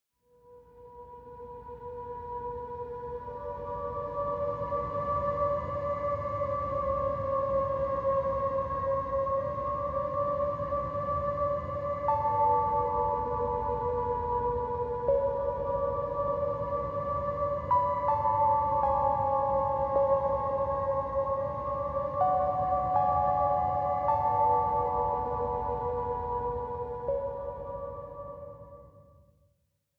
Soundscapes > Synthetic / Artificial
Dark Mystery Atmosphere
Ominous and unsettling ambient track with dark melodies and eerie textures. Ideal for horror, suspense, or tense cinematic scenes.